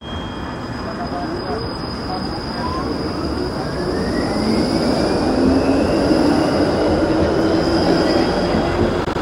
Sound effects > Vehicles
Tram sound
Tram arrival and departure sequences including door chimes and wheel squeal. Wet city acoustics with light rain and passing cars. Recorded at Sammonaukio (17:00-18:00) using iPhone 15 Pro onboard mics. No post-processing applied.
15, city, iPhone, light, mics, Pro, rain, Tram